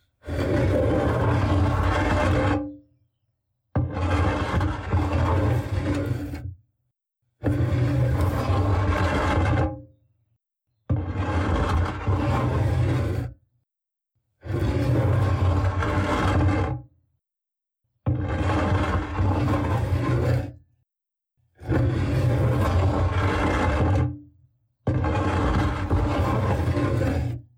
Sound effects > Other
Stone heavy - Scraping concrete
Granular heavy stone pushed onto a concrete surface. * No background noise. * No reverb nor echo. * Clean sound, close range. Recorded with Iphone or Thomann micro t.bone SC 420.
abrasive, avalanche, boulders, concrete, dirt, dust, earth, falling, granite, granular, gravel, grit, mountain, particles, pebbles, quarry, rock, rocks, rubble, rumble, scrap, scraping, stone, stones